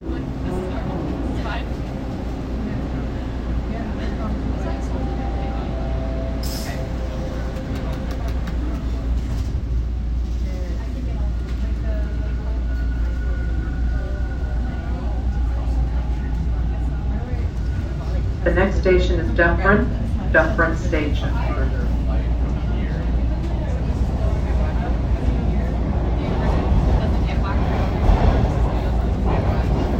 Soundscapes > Urban
TTC Subway Line 2 Ambience - Dufferin to Ossington
TTC Subway train ride from Dufferin to Ossington. Ambience, including next station announcements. Recorded with iPhone 14 Pro Max, on September 13 2025.
ambience, announcement, subway, toronto, transit, ttc, voices